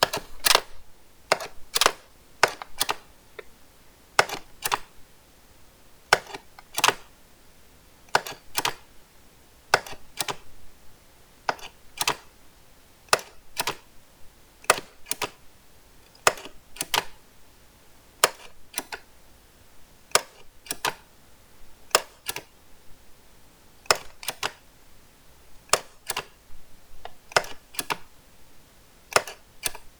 Sound effects > Objects / House appliances
OBJOffc-Blue Snowball Microphone, CU Stapler, On Paper, No Staple Nicholas Judy TDC
A stapler stapling on paper with no staple.